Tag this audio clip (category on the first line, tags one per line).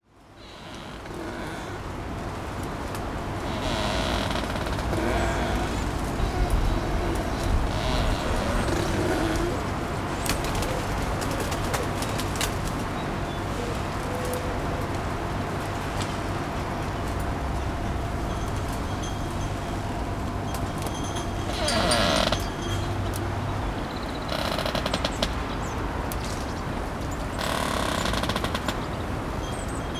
Soundscapes > Urban
traffic
residential
Field
recording
ambience
birds